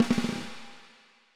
Music > Solo percussion
Snare Processed - Oneshot 18 - 14 by 6.5 inch Brass Ludwig
acoustic, beat, brass, crack, drum, drumkit, drums, flam, fx, hit, hits, kit, ludwig, oneshot, perc, percussion, processed, realdrum, realdrums, reverb, rim, rimshot, rimshots, roll, sfx, snare, snaredrum, snareroll, snares